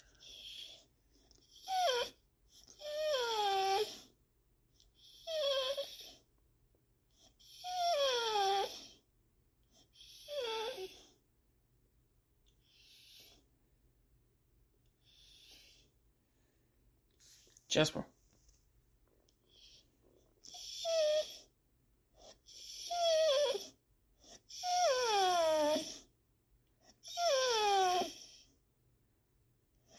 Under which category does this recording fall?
Sound effects > Animals